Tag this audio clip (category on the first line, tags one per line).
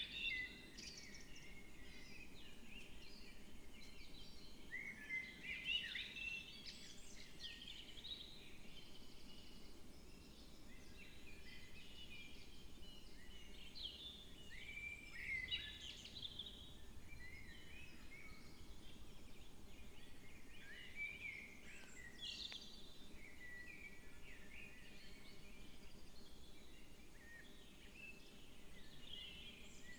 Soundscapes > Nature
phenological-recording
Dendrophone
sound-installation
alice-holt-forest
weather-data
natural-soundscape
field-recording
nature
data-to-sound
raspberry-pi
artistic-intervention